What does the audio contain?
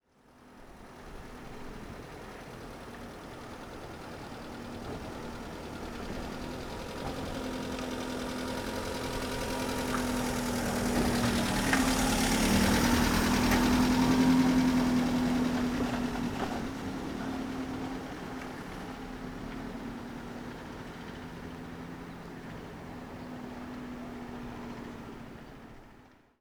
Sound effects > Vehicles
Light farming truck passing on dirt road
road, car, dirt, driving, vehicle, truck, passing